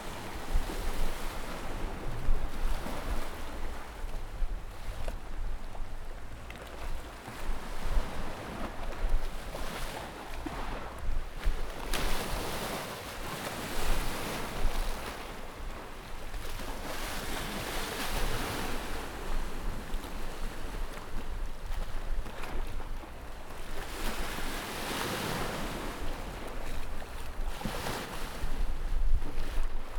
Soundscapes > Nature
Beach Ambience (close to the sea)
Recorded with AT2020 and Rode AI-1 Beach sounds from the Yucatan Peninsula at
Ocean, seaside, shore, waves